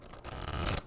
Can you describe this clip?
Sound effects > Objects / House appliances
GAMEMisc-Blue Snowball Microphone Cards, Shuffle 04 Nicholas Judy TDC
Cards being shuffled.
Blue-brand, Blue-Snowball, cards, foley, shuffle